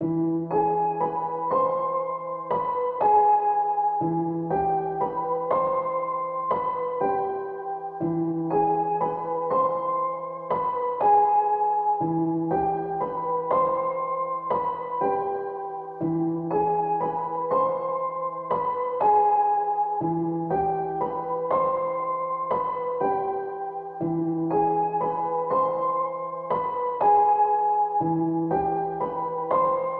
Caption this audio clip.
Music > Solo instrument
Piano loops 065 efect 4 octave long loop 120 bpm
piano, reverb